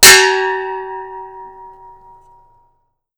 Sound effects > Objects / House appliances
METLImpt-Blue Snowball Microphone Metal, Clang 03 Nicholas Judy TDC
Blue-brand, Blue-Snowball, clang, impact, metal